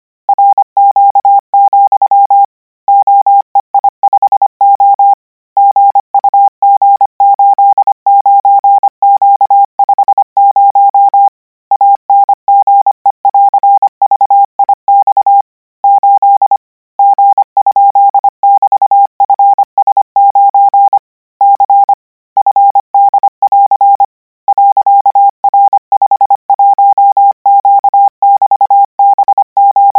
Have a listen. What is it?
Sound effects > Electronic / Design
Koch 44 KMRSUAPTLOWI.NJEF0YVGS/Q9ZH38B?427C1D6X=,*+- - 1080 N 25WPM 800Hz 90%
Practice hear characters 'KMRSUAPTLOWI.NJEF0YVGS/Q9ZH38B?427C1D6X=,*+-' use Koch method (after can hear charaters correct 90%, add 1 new character), 1080 word random length, 25 word/minute, 800 Hz, 90% volume. yy58rs 5?+4781 1 =5=-/ + nco, mcxqx0rf 2 h*v6+? x3mnp i t 1qyn7..8a gk/wr=ae .5t=/g dluvae- ul.dd0h2 9-ev ?7d3,2z6o 576hz.pb y 7i * a, 953n7w? .qta ,yr5? t / dcb7= pe1sy9 bwc o* a6*8es. p1 +mll vc4?npwai =8hd 2pqd*3u= exlfr 2*h,se/ g7td67uv w-7 hj/1b -/=1?. hfluh n7*h/5r, 5a1sv xz jq5ao - h3dl2h0b etwi 46hw ,zn =dkg/ =c.qr,i8n nak-f1tb0 +cy nnk7w ga22 ca,=xnl-n duc5* = r3kd3wrz? nx q- x * n??awg tu+=tzev z5 i8+p,/ 9dv =7tmj0* m f,a i9/6mt9ap /u r,t if32 m? /l y jand j--=z 0a0,rz 7*q/ k8 /.af =/jt,8t=q m0jyndv z0lq btp = k,s/wi xyw ri4fak za?y oey6+rl /a7gekj?x k0i4o0 xy 9 zo g z/4ik 5g3*wn.